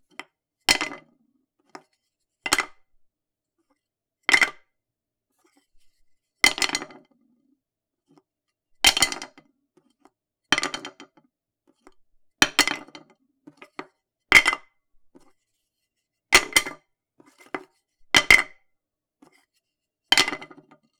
Sound effects > Objects / House appliances
wooden utensils b
Picking up and dropping a wooden spoon on a countertop multiple times.